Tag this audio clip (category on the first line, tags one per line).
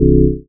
Instrument samples > Synths / Electronic
additive-synthesis
fm-synthesis
bass